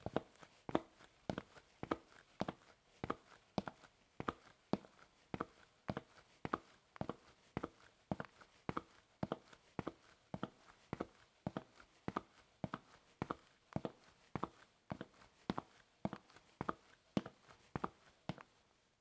Sound effects > Human sounds and actions
footsteps, tile, walk
foley, footsteps, tile, walking